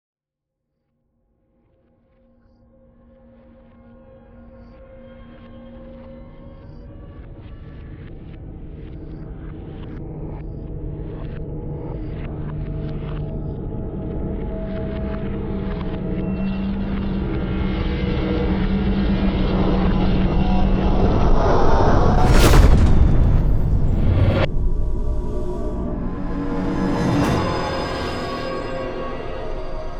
Sound effects > Other
Sound Design Elements SFX PS 077
cinematic, explosion, implosion, sweep